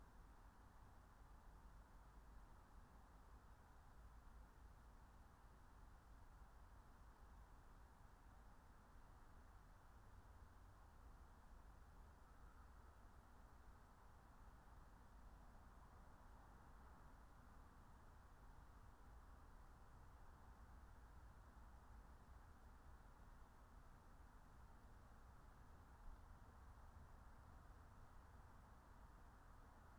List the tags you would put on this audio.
Soundscapes > Nature
natural-soundscape
field-recording
meadow
soundscape
phenological-recording
raspberry-pi
alice-holt-forest
nature

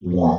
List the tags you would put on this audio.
Sound effects > Other
weak
spell
dark

